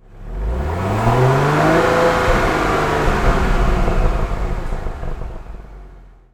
Sound effects > Vehicles
2019 Porsche Cayenne Slowly Revving
Recorded from the exhaust from the car, mixed with the recording from the engine.
automobile, car, Cayenne, engine, motor, porche, porsche, rev, revving, vehicle